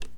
Sound effects > Objects / House appliances
A "Milk Carton" being hit and smacked on a surface in various ways recorded with a simple usb mic. Raw.
carton, clack, click, foley, industrial, plastic